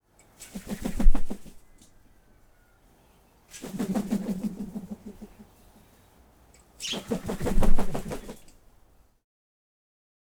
Sound effects > Animals
BIRDMisc Swoop Wing Flap
flap, wing, sfx, wings, birds, swoop, bird